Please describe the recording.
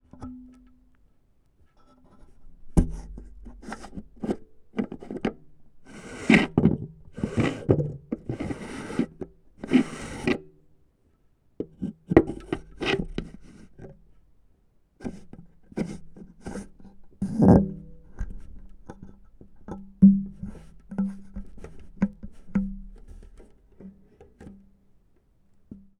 Instrument samples > Percussion
MUSCStr-Contact Mic Strings body touching SoAM Sound of Solid and Gaseous Pt 1
cello, hit, percussion, percussive